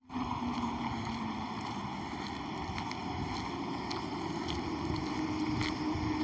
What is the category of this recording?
Sound effects > Vehicles